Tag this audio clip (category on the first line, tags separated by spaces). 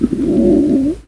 Sound effects > Human sounds and actions
upset stomach Phone-recording growl hungry